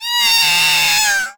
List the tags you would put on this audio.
Speech > Solo speech
Blue-brand Blue-Snowball comedic goat scream

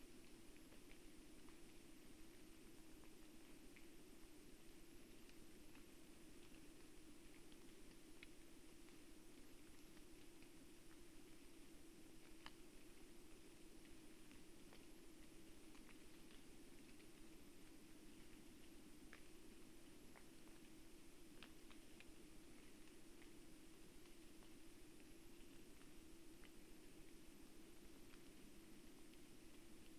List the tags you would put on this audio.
Soundscapes > Nature
natural-soundscape artistic-intervention soundscape sound-installation weather-data raspberry-pi phenological-recording field-recording nature modified-soundscape Dendrophone alice-holt-forest data-to-sound

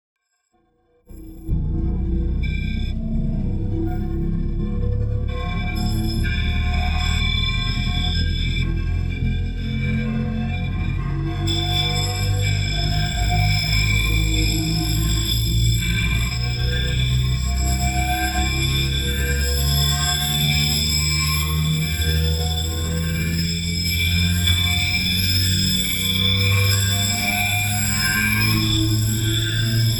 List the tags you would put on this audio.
Soundscapes > Synthetic / Artificial
ambience ambient atmosphere bass effect evolving experimental fx glitch howl landscape long roar shifting shimmer wind

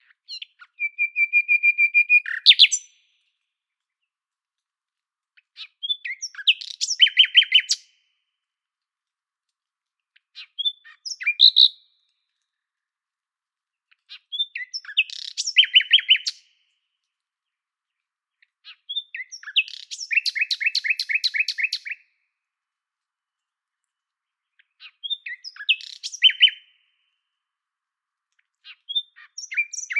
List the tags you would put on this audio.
Sound effects > Animals
bird common-nightingale forest Luscinia-megarhynchos nature nightingale spring

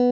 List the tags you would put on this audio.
Instrument samples > String
arpeggio cheap design guitar sound stratocaster tone

